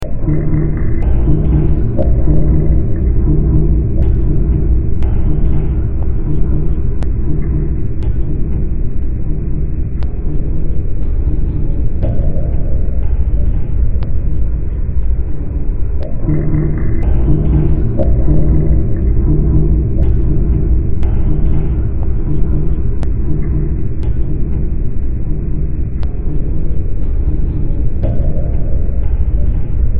Music > Multiple instruments
Demo Track #3021 (Industraumatic)
Underground, Cyberpunk